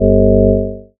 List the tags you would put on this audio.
Instrument samples > Synths / Electronic
bass
fm-synthesis
additive-synthesis

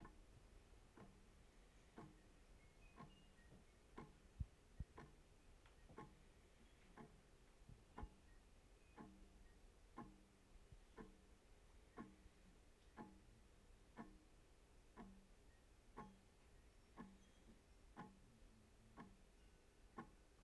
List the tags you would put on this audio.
Sound effects > Objects / House appliances
ticking
appliances
house
Wallclock
Clock
tick

